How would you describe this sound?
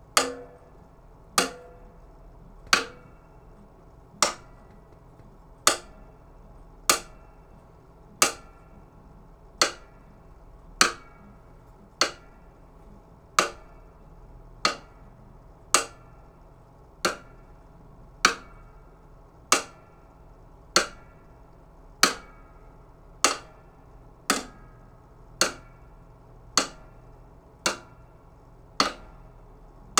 Music > Solo percussion
MUSCPerc-Blue Snowball Microphone, CU Drum, Snare, Rim, Hit Nicholas Judy TDC
Snare drum rim hits.